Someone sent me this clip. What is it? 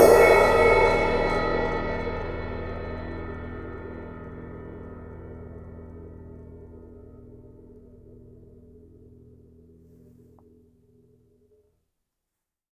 Music > Solo instrument
Sabian 15 inch Custom Crash-14
15inch, Crash, Custom, Cymbal, Cymbals, Drum, Drums, Kit, Metal, Oneshot, Perc, Percussion, Sabian